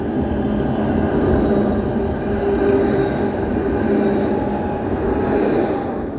Sound effects > Vehicles
Tram passing by at moderate speed (30 kph zone) on a track embedded in asphalt. Recorded in Tampere, Finland, in December 2025 in a wet weather with mild wind. May contain slight background noises from wind, my clothes and surrounding city. Recorded using a Samsung Galaxy A52s 5G. Recorded for a university course project.
embedded-track, moderate-speed, passing-by, Tampere, tram